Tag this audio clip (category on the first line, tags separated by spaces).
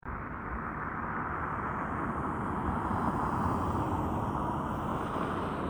Sound effects > Vehicles
engine vehicle car